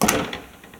Sound effects > Objects / House appliances
Door being opened. Recorded with my phone.